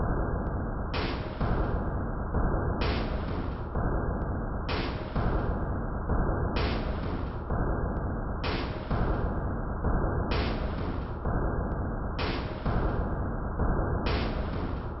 Instrument samples > Percussion
This 128bpm Drum Loop is good for composing Industrial/Electronic/Ambient songs or using as soundtrack to a sci-fi/suspense/horror indie game or short film.
Packs, Loop, Weird, Dark, Ambient, Loopable, Industrial, Samples, Underground, Drum, Soundtrack, Alien